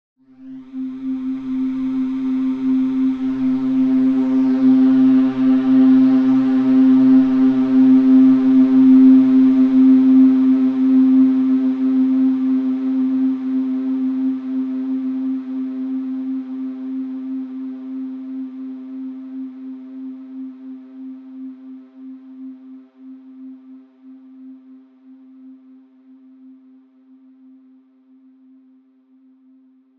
Instrument samples > Synths / Electronic
Deep Pads and Ambient Tones20
Ambient, Analog, bass, bassy, Chill, Dark, Deep, Digital, Haunting, Note, Ominous, Oneshot, Pad, Synth, Synthesizer, synthetic, Tone, Tones